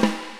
Music > Solo percussion
Snare Processed - Oneshot 168 - 14 by 6.5 inch Brass Ludwig
acoustic, beat, brass, crack, drum, drumkit, drums, flam, fx, hit, hits, kit, ludwig, oneshot, perc, percussion, processed, realdrum, realdrums, reverb, rim, rimshot, rimshots, roll, sfx, snare, snaredrum, snareroll, snares